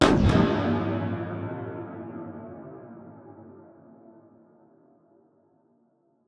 Sound effects > Experimental
dark impact verb percs 17 by CVLTIVR
glitchy, impact, otherworldy, perc, pop, whizz